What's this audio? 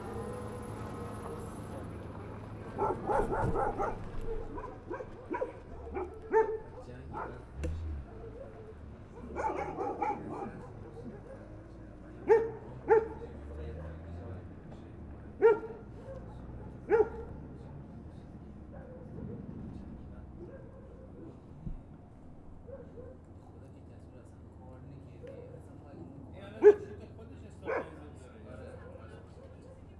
Soundscapes > Nature
Prison Dogs Barking Night Distant Echo
Authentic recording of dogs barking inside or near a prison environment. Recorded at night with natural reverb and distant echoes, creating a tense and unsettling atmosphere. Suitable for films, documentaries, games, sound design, and dark ambient scenes. No artificial processing applied. Raw and realistic sound.
ambient, atmosphere, barking, dark, dog, dogs, field, jail, night, prison, security, tension